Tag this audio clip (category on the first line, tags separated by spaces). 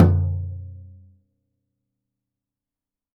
Music > Solo instrument
Ride Drum Hat Crash Drums Oneshot Cymbal FX Paiste GONG Kit Cymbals Custom Percussion